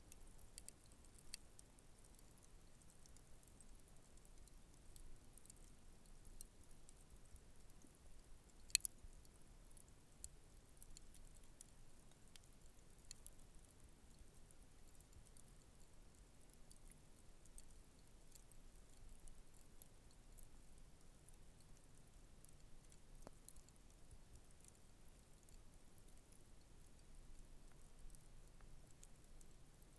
Soundscapes > Nature
Field recording of a dying campfire's embers with wind, plane, and vehicle noises in the background.